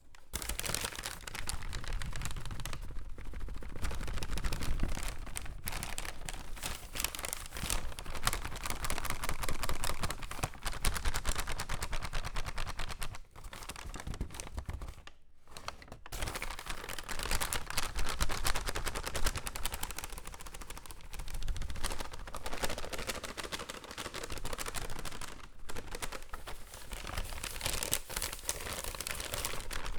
Sound effects > Objects / House appliances
paper fluttering, paper noise
noise, fluttering, paper